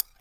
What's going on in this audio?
Sound effects > Other mechanisms, engines, machines
shop foley-027
bam, foley, metal, oneshot, percussion, pop, rustle, sound, thud, tink